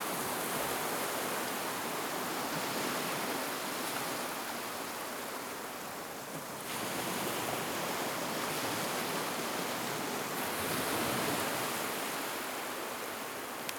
Soundscapes > Nature
SeaShore Wave 1
wave, seashore